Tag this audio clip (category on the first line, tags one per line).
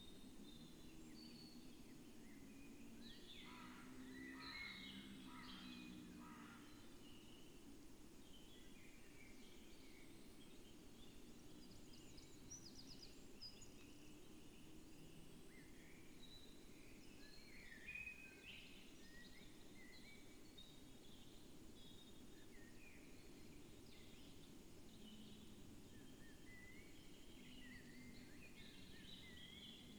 Soundscapes > Nature
phenological-recording
field-recording
natural-soundscape
Dendrophone
sound-installation
artistic-intervention
modified-soundscape
data-to-sound
soundscape
weather-data
alice-holt-forest
nature
raspberry-pi